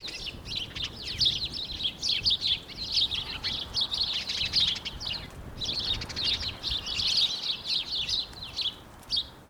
Soundscapes > Nature
BIRDSong AMBBird Finches and Robins
Ambience recording of robins and finches in a bush located in the suburbs on an overcast late winters day.
ambience, bird, birds, bird-song, birdsong, field-recording, finch, nature, robin, spring, suburb